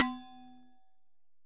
Sound effects > Objects / House appliances
Resonant coffee thermos-000
sampling, percusive, recording